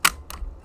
Sound effects > Objects / House appliances
COMCam-Blue Snowball Microphone, CU Canon DL 9000, Shutter Nicholas Judy TDC
A Canon DL-9000 camera shutter.
dl-9000, camera, Blue-brand, canon, foley, shutter, Blue-Snowball